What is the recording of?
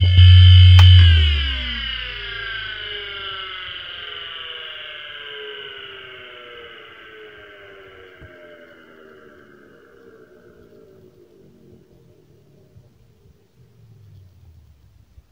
Sound effects > Objects / House appliances
hd-off
A broken hard disk spinning powered off.
electric-motor, machine, noise